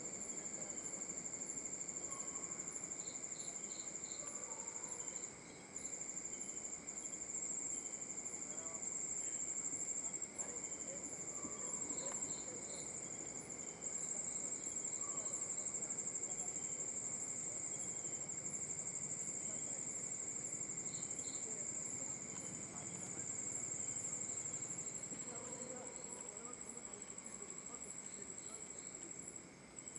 Soundscapes > Nature
Night Crickets on a Quiet Road – Goa Ambience
Nighttime ambient sound recorded on a silent road in Goa, India. Crickets fill the air with a steady, natural rhythm. Peaceful and atmospheric—great for late-night rural or tropical scenes.
ambience, ambient, field-recording, nature